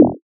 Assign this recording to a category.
Instrument samples > Synths / Electronic